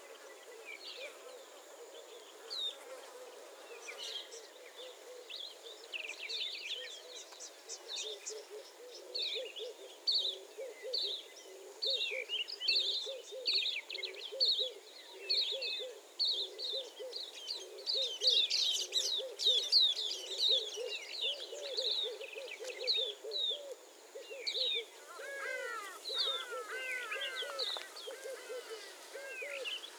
Soundscapes > Nature
Birds Bontebok NP South Africa
Diverse birdsong in the early afternoon near the Breede River in Bontebok National Park, Swellendam, South Africa. Recorded with Clippy Em272s on 20 January 2025.
birds, nature